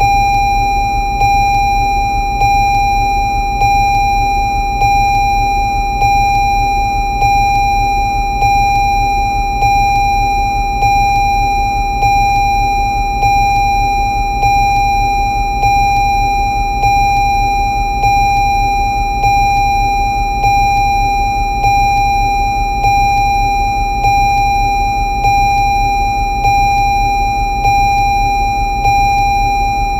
Sound effects > Vehicles
BEEPVeh-Samsung Galaxy Smartphone, CU Warning Chime, Slow, Toyota Highlander, Looped Nicholas Judy TDC
A slow warning chime on a Toyota Highlander.
toyota-highlander, Phone-recording, chime, warning, car, slow